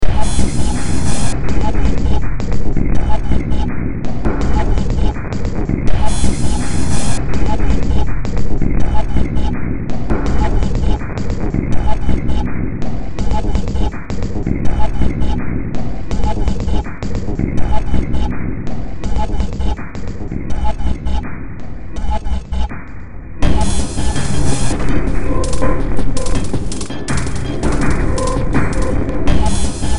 Music > Multiple instruments
Demo Track #3978 (Industraumatic)
Soundtrack, Games, Sci-fi, Noise, Industrial, Ambient, Horror, Cyberpunk, Underground